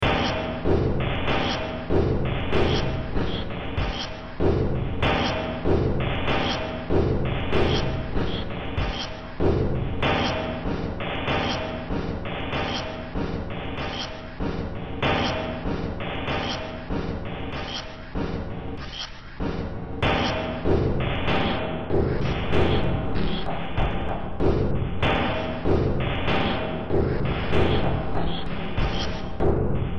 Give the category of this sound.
Music > Multiple instruments